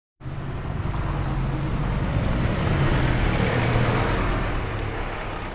Sound effects > Vehicles
A bus passes by
bus-stop, Passing